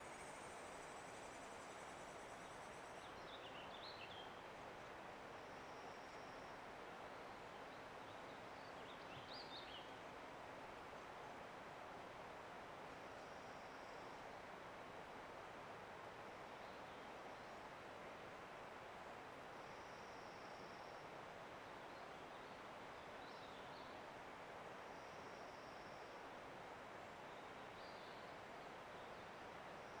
Soundscapes > Nature
AMBForst MTR Distant Creek, Animals, Birds 01 ShaneVincent PCT25 20250713
Distant flowing water, birds, animals, and general forest ambience. This recording, along with the others in this pack, were taken during a 50-day backpacking trip along a 1000 mile section of the Pacific Crest Trail during the summer of 2025. Microphone: AKG 214 Microphone Configuration: Stereo AB Recording Device: Zoom F3 Field Recorder
animals, birds, distant-water, field-recording